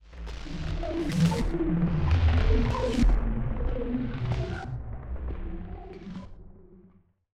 Sound effects > Electronic / Design
WEIRD RUMBLE
air, company, effect, flyby, gaussian, jet, pass-by, sound, swoosh, transision, ui, whoosh